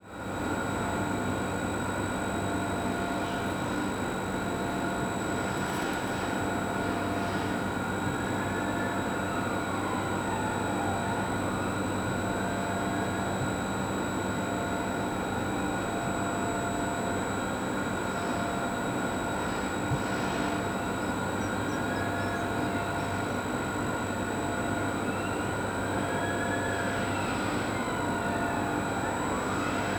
Soundscapes > Urban
Splott - Water Tower Electrical Hum 02 Police Siren - Splott Beach Costal Path
fieldrecording, splott, wales